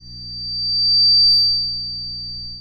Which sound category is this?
Sound effects > Electronic / Design